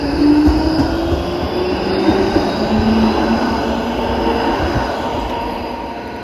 Soundscapes > Urban
ratikka11 copy
tram, vehicle